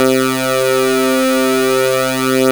Sound effects > Electronic / Design
perfectly loopable crappy chorus thing i made
made in openmpt. sounds silly
a, b, c, chorus, crappy, d, e, hi, loop, loopable, looping, pad, retro, seamless, synth